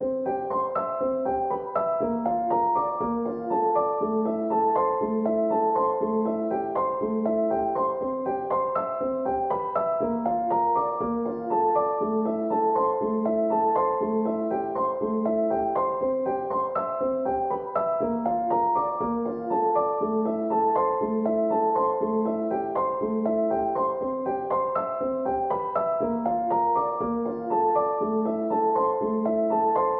Music > Solo instrument
Piano loops 196 octave long loop 120 bpm
samples, piano, music, 120, loop, reverb, simplesamples, pianomusic, simple, free, 120bpm